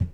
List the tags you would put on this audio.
Sound effects > Objects / House appliances
plastic hollow pail pour household drop object fill scoop debris slam spill bucket foley container shake

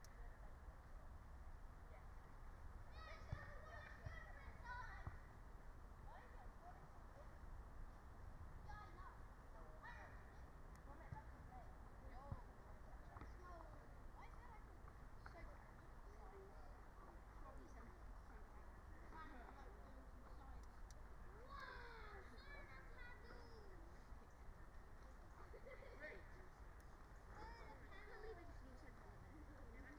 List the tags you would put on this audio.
Soundscapes > Nature
raspberry-pi field-recording meadow nature phenological-recording soundscape alice-holt-forest natural-soundscape

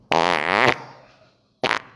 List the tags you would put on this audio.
Sound effects > Other
gas flatulence fart

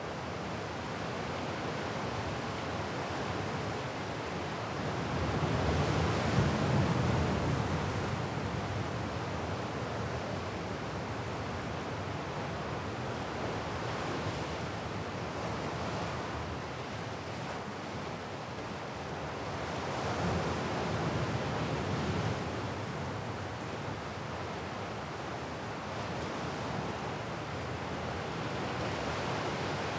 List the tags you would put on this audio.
Soundscapes > Nature
coastal loop pontadoarnel stereo ocean wind coast shoreline sea fieldrecording water natural azores soundscape nature portugal surf foam saomiguel relaxation marine seaside lighthouse waves environmental ambience

